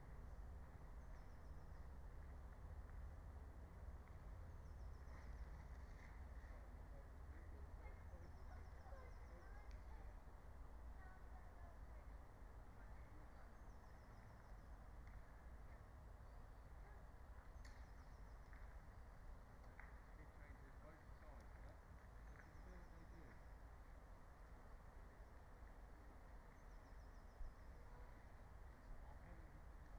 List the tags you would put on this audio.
Soundscapes > Nature
phenological-recording
soundscape

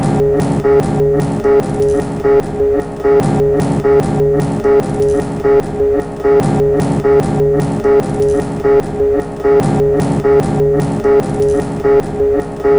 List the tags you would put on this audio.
Instrument samples > Percussion
Underground
Loop
Soundtrack
Samples
Dark
Packs
Alien
Drum
Loopable
Weird
Industrial
Ambient

